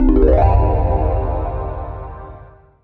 Instrument samples > Synths / Electronic
CVLT BASS 117
bass, bassdrop, clear, drops, lfo, low, lowend, stabs, sub, subbass, subs, subwoofer, synth, synthbass, wavetable, wobble